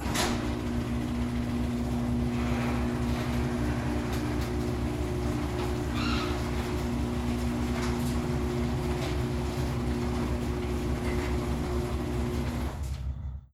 Sound effects > Other mechanisms, engines, machines
DOORElec-Samsung Galaxy Smartphone Garage, Door, Mechanical, Open Nicholas Judy TDC
A mechanical garage door opening.
Phone-recording, open, foley, door, garage